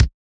Instrument samples > Percussion
All samle used from FL studio original sample pack. I just pick up a FPC kick and Layered some Grv kick as its transient. Processed with ZL EQ, Waveshaper, FuzzPlus3, OTT, Khs Distortion.